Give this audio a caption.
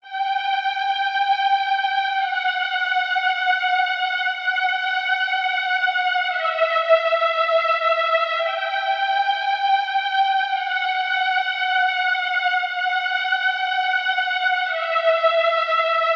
Music > Solo instrument
ROMANTIC STRINGS
80s, cinematic, film, love, melancholic, movie, romance, romantic, slow, strings, synths